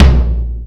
Instrument samples > Percussion
floor 1 tom 2013
ashiko, bougarabou, deeptom, hit, PDP, Premier, Sonor